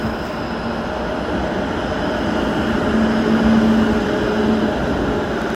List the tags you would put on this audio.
Soundscapes > Urban
tram
urban
transportation

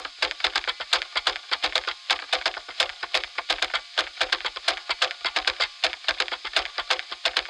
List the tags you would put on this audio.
Music > Solo percussion
techno
acoustic
guitar

